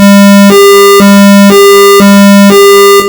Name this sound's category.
Sound effects > Electronic / Design